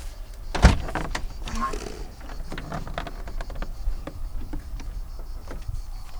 Sound effects > Vehicles
Ford 115 T350 - Steering wheel
115, 2003, 2003-model, 2025, A2WS, August, Ford, Ford-Transit, France, Mono, Old, Single-mic-mono, SM57, T350, Tascam, Van, Vehicle